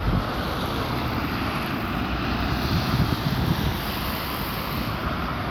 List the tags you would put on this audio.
Soundscapes > Urban
car
engine
vehicle

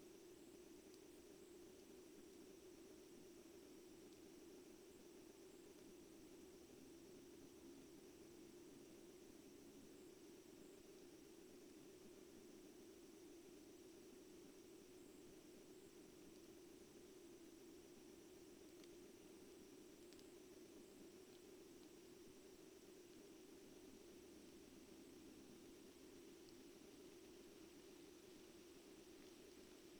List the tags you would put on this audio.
Soundscapes > Nature
nature
field-recording
modified-soundscape
data-to-sound
Dendrophone
sound-installation
alice-holt-forest
raspberry-pi
natural-soundscape
weather-data
soundscape
phenological-recording
artistic-intervention

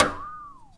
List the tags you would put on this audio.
Sound effects > Other mechanisms, engines, machines
twang
percussion
twangy
sfx
vibe
shop
tool
metallic
hit
smack
foley
plank
fx
vibration

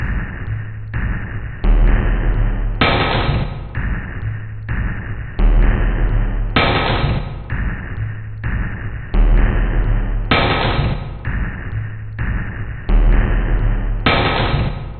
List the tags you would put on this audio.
Instrument samples > Percussion
Samples
Loop
Ambient
Weird
Packs
Dark
Loopable
Industrial
Drum
Alien
Soundtrack
Underground

